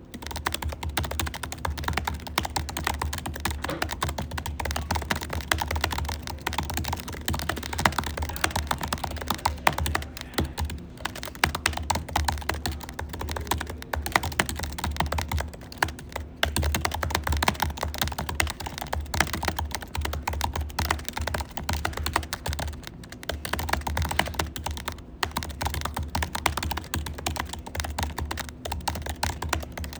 Sound effects > Human sounds and actions
Recording of person typing email on Apple MacBook Air in quiet university lounge. Recorded September 2, 2025.